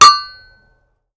Music > Solo percussion
MUSCTnprc-Blue Snowball Microphone, CU Agogo Bells, Single Low Note Nicholas Judy TDC
A single, low agogo bell note.
Blue-brand, single, low, agogo-bell, note, bell, agogo, Blue-Snowball